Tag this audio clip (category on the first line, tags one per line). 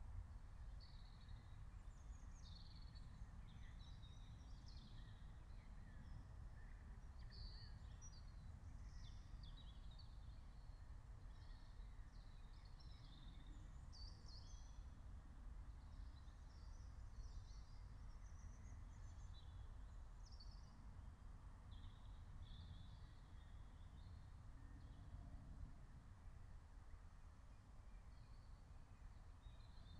Soundscapes > Nature
meadow
nature
phenological-recording
alice-holt-forest